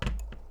Sound effects > Objects / House appliances
FOLYProp-Blue Snowball Microphone Alarm Clock, Put Down Nicholas Judy TDC
An alarm clock being put down.